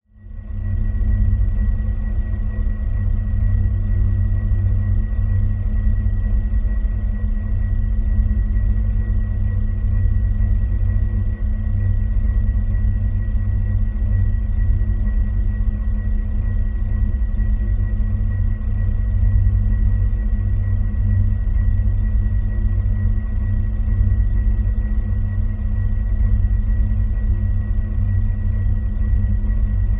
Soundscapes > Urban
A recording of the internal vibrations of a busted carbon monoxide fan in the bottom of a parking garage. Equipment LOM Geofon geophone mic Zoom F3 field recorder
industry, drone, carbonmonoxide, sounddesign, soundscape, noise, machinery, fan, industrial, fieldrecording, machine, parkinggarage, dark, ambient